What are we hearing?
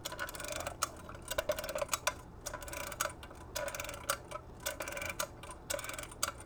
Sound effects > Objects / House appliances
CLOCKMech-Blue Snowball Microphone, MCU Windup, Wind Nicholas Judy TDC
A wind-up alarm clock winding.
Blue-brand, Blue-Snowball, alarm, wind, wind-up, clock